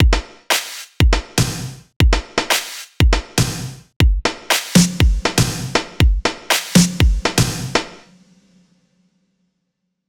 Music > Solo percussion
120bpm - Beat Drum AIO - Master
Beat Drum in all simplicity!
Beats, drums, rythms